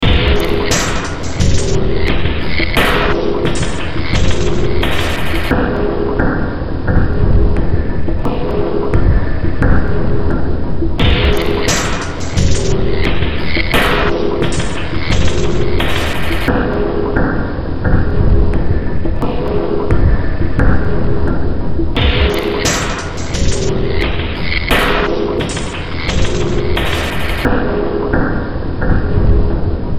Music > Multiple instruments
Demo Track #3202 (Industraumatic)
Ambient, Cyberpunk, Games, Horror, Industrial, Noise, Sci-fi, Soundtrack, Underground